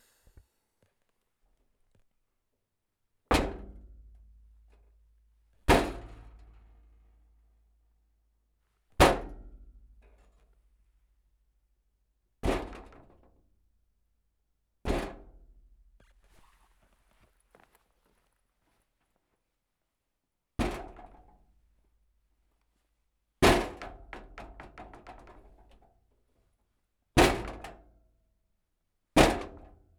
Sound effects > Other
Kicking an old radiator heater made of thin pieces of sheet metal.